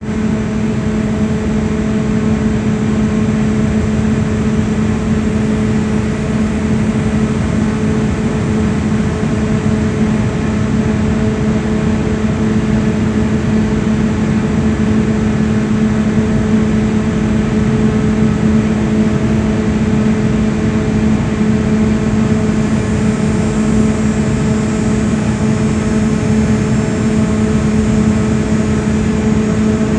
Sound effects > Other mechanisms, engines, machines
Air Conditioning Vent, Close to A220
Recording of air conditioning unit on roof of University of Michigan School of Music, Theatre & Dance. Originally mistaken for celli tuning at A = 220 Hz (sound captured outside of cello studio). Recorded August 31, 2025.
air-conditioning, outside, school-building